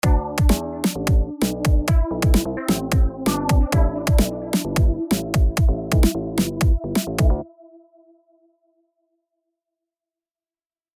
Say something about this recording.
Music > Multiple instruments
Little beat i made in FL-Studio. If you want to loop it, you might need to cut it down a bit.